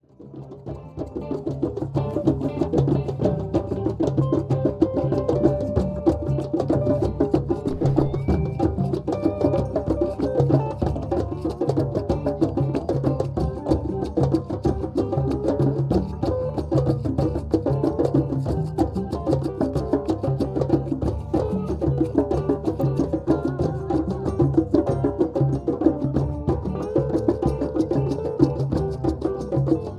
Music > Multiple instruments
Hippie Hill Drum Circle, Golden Gate Park, San Francisco zoom F3 / immersive soundscapes ORTF
beat, bongo, California, chill, conga, djembe, doumbek, drum, drum-circle, drum-loop, drummer, drums, field-recording, Golden-Gate-Park, grass, groove, groovy, Haight, hippie, Hippie-Hill, improvised, music, percs, percussion, percussion-loop, relax, rhythm, San-Francisco, smoke, stoner